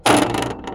Sound effects > Human sounds and actions
Rock Throw Sign
A rock thrown at a sign, making a rattling sound recorded on my phone microphone the OnePlus 12R